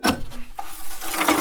Sound effects > Other mechanisms, engines, machines
Samples of my Dewalt Chopsaw recorded in my workshop in Humboldt County California. Recorded with a Tascam D-05 and lightly noise reduced with reaper